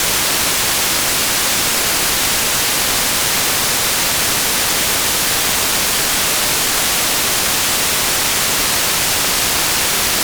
Instrument samples > Synths / Electronic
NOISE Sequential OB-6
Noise Oscillator - Sequential OB-6
Analog, Noise, Sequential, Synthesizer